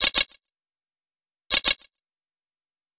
Sound effects > Electronic / Design
01 - Alarms & Beeps - Cannot Execute A
Cool UI beep, can be used for alarms + alerts too.
beep, electronic, alert, UI, scifi, sci-fi, alarm